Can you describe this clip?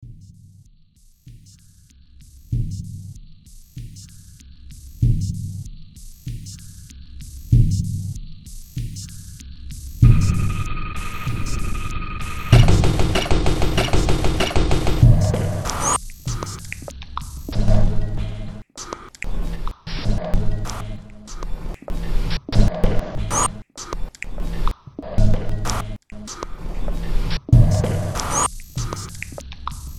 Music > Multiple instruments
Demo Track #3005 (Industraumatic)
Horror, Sci-fi, Underground